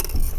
Sound effects > Objects / House appliances
knife and metal beam vibrations clicks dings and sfx-040
Beam, Clang, ding, Foley, FX, Klang, Metal, metallic, Perc, SFX, ting, Trippy, Vibrate, Vibration, Wobble